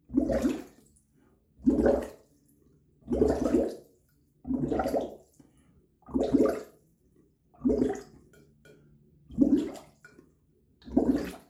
Sound effects > Natural elements and explosions
WATRBubl-Samsung Galaxy Smartphone Bubble, Glugs, Multiple, Short 02 Nicholas Judy TDC
Multiple, short bubble glugs.
glug, Phone-recording